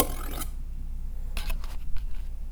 Sound effects > Objects / House appliances
knife and metal beam vibrations clicks dings and sfx-050
Beam, Clang, ding, Foley, FX, Klang, Metal, metallic, Perc, SFX, ting, Trippy, Vibrate, Vibration, Wobble